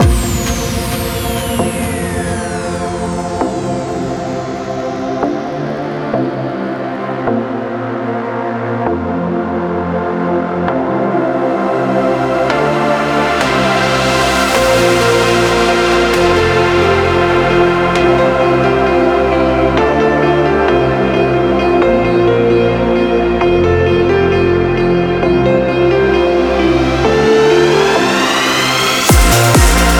Music > Multiple instruments
Positive Trance Melody (JH)
classic, dance, happy, hypersaw, melody, Positive, supersaw, trance